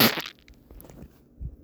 Sound effects > Human sounds and actions
I made this sound by drinking a soda (to get that gross stringy spit, necessary for the nastiness of the sound) and then making a impact-squelch-spit noise that was muffled by my blanket over my mouth. I got that stringy spit on my blanket but it was kinda worth it tbh.